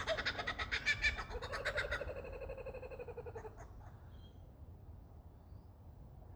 Sound effects > Animals
A laugh from a kookaburra.
field-recording, Australia, sound-effect, nature, bird, kookaburra